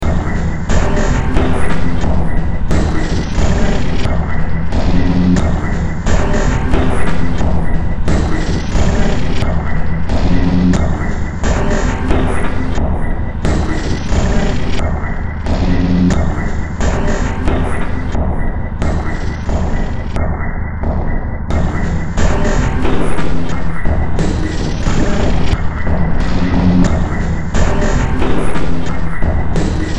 Music > Multiple instruments
Demo Track #2966 (Industraumatic)
Underground, Noise, Ambient, Horror, Soundtrack, Games, Cyberpunk, Sci-fi, Industrial